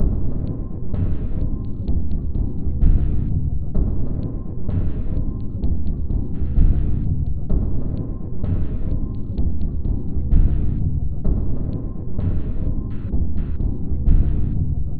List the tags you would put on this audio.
Soundscapes > Synthetic / Artificial
Alien Samples Underground Industrial Weird Packs Loop Ambient Soundtrack Drum Loopable Dark

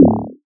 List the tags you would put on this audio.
Instrument samples > Synths / Electronic
fm-synthesis additive-synthesis

